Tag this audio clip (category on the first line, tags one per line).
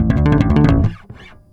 Instrument samples > String
fx
blues
bass
loops
loop
mellow
electric
slide
pluck
rock
plucked
oneshots
riffs
charvel
funk